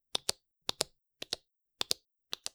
Sound effects > Objects / House appliances
Button Click
Sound of clicking 5 different buttons. Recording from my microphone while clicking stream deck buttons, edited in audacity. Enjoy!